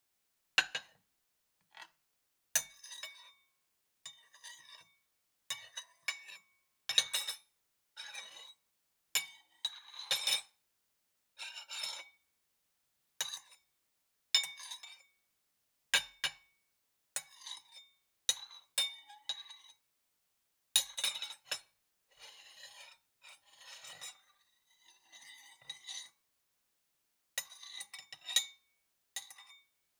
Sound effects > Objects / House appliances
FOODTware Ceramic Bowl metal spoon
Using a metal spoon in/on a ceramic bowl, scooping, scraping, tapping. Processed in iZotope RX to remove noise.
bowl, ceramic, metal, metalic, scoop, scrape, sfx, spoon, tap, tapping